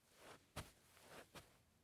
Sound effects > Human sounds and actions
footsteps, carpet, shuffle1
shuffling, footsteps, foley, carpet